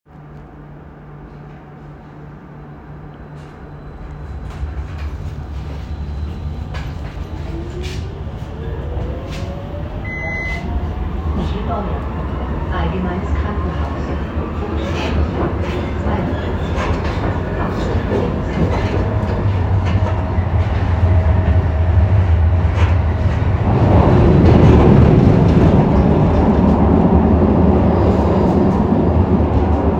Soundscapes > Urban
Vienna U6 Metro – Onboard & Platform Ambience (Michelbeuern → Währingerstraße)
Authentic field recording from Vienna’s U6 metro line. The sound begins inside the train: acceleration, interior rumble, passengers, and the official station announcement “Michelbeuern – Allgemeines Krankenhaus”. You hear braking, arrival in the station, door warning beeps, doors opening, faint passenger movement, and departure. The train continues to the next stop, including the announcement “Währingerstraße – Volksoper, Umsteigen zu Straßenbahnen”. Arrival ambience, doors opening, audible disembarking. The perspective then shifts outside the train: platform ambience, doors closing, and the train pulling out of the station. Clean, realistic urban public-transport ambience suitable for films, games, radio, and sound design. Fahrt Linie U6 Wien - innen Ankunft Währingerstr Türen Aussteigen Zug fährt ab Authentische Klangaufnahme aus der Wiener U-Bahn-Linie U6.